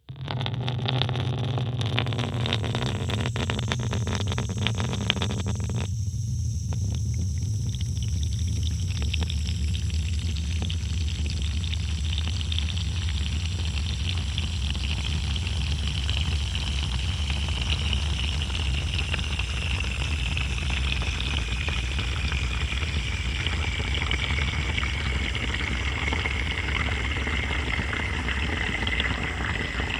Sound effects > Objects / House appliances
A hydrophone recording of water running from a tap into a washing up bowl.